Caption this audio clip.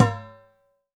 Sound effects > Objects / House appliances
Round baking tray new 2
A single hit of a shiny, new metal baking tray with a drum stick. Recorded on a Shure SM57.